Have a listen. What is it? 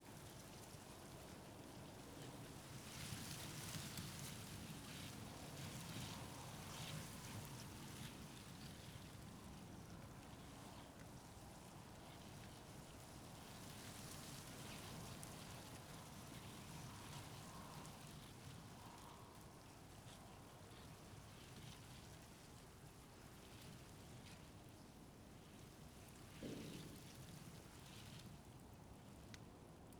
Soundscapes > Nature
A light breeze, the rustle of reeds. The rustle of dry grass
breeze, dry, gras, reeds, rustle, rustling, wind